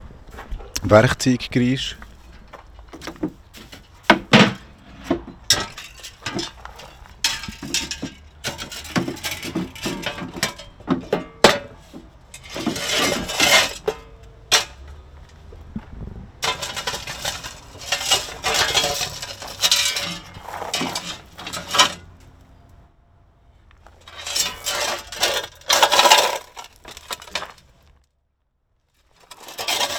Sound effects > Objects / House appliances
Tool Sounds
Me putting my hans on some gardening tools.